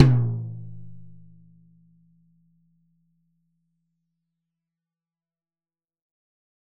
Music > Solo percussion
Med-low Tom - Oneshot 20 12 inch Sonor Force 3007 Maple Rack
Sample from a studio recording at Calpoly Humboldt in the pro soundproofed studio of a medium tom from a Sonor 3007 maple rack drum, recorded with 1 sm57 and an sm58 beta microphones into logic and processed lightly with Reaper